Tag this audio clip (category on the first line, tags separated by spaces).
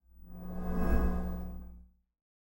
Sound effects > Electronic / Design
air flyby gaussian jet pass-by Sound swoosh synth transition ui whip whoosh